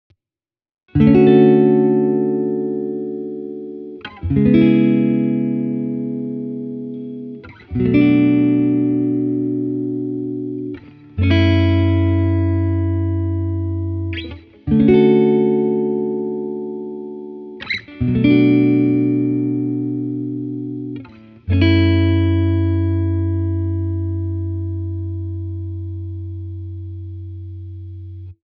Music > Solo instrument
Guitar Hollowbody loop 70 bpm A maj 3
Some jazzy chords on my guitar
Chords, Epiphone, Guitar, Jazz, Soft